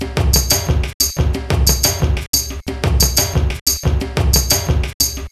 Music > Other
ethno beat 7 ver 180 bpm
percs, loop, rhythmic, ethnique, rhythm, percussion-loop, quantized